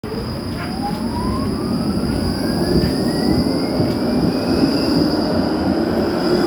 Sound effects > Vehicles
A tram is passing by and speeding up. Recorded in Tampere with a samsung phone.